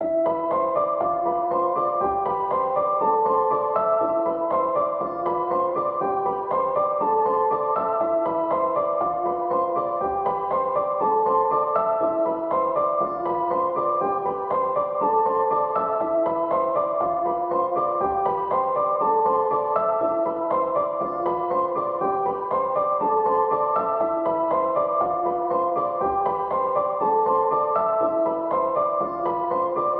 Music > Solo instrument
Piano loops 164 efect 4 octave long loop 120 bpm
pianomusic, samples